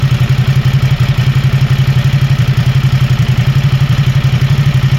Sound effects > Other mechanisms, engines, machines
puhelin clip prätkä (1)
Ducati, Motorcycle, Supersport